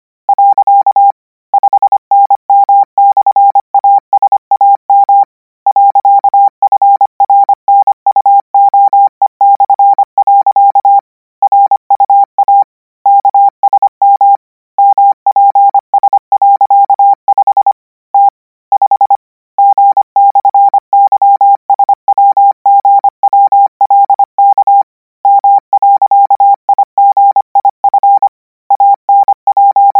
Sound effects > Electronic / Design
Koch 23 KMRSUAPTLOWI.NJEF0YVGS/ - 660 N 25WPM 800Hz 90
Practice hear characters 'KMRSUAPTLOWI.NJEF0YVGS/' use Koch method (after can hear charaters correct 90%, add 1 new character), 660 word random length, 25 word/minute, 800 Hz, 90% volume. Code: . 5nm/asam .frnuoe/. jjt0ae /egu ftt uvtkaw /iv e e jm o vlaa/ gfaus ka p m/gjrn .epnv vwy e0f uf lsinmi gw atu vnus vfllaks/ mey .kft fufmwka n.if roy e mlgs o.kopt f.o0fs ki/p5wrl p0ylvoin 0ta0 jwgk5 5ll ljt.woif tmwwpkpm ayfvk jeup peggfom g0 5n/svfs ylui55 pnf.r/ /norjp0o efu p5e5w0v f0w/ 5pugu inpvnka oep//5ljl wkv a.asyo0kt tnr5tm0fi owfyyy av sy0r t.omvolsa wk0gi.mea wkaonw.r5 0/ ukits . ..es uuwsi swmijl nkr.ektg uant0r/gj vrkm05.. sgww .
morse, codigo, code, radio, characters